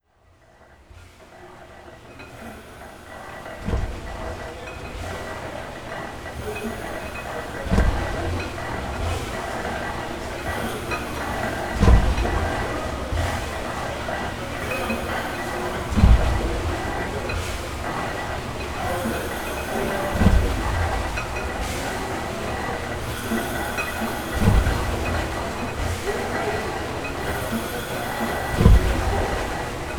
Sound effects > Other mechanisms, engines, machines
The sounds of a working steam-powered Victorian beam engine, recorded during one of its open-to-the-public steaming days. Rhythmic thumping and pumping, whirring and hissing. Background chatter from visitors also audible. Recorded with a hand-held Zoom H5, using its standard X/Y microphone capsule.